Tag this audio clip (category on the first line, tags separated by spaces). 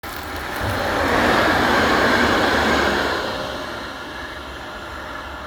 Soundscapes > Urban
Field-recording Railway Tram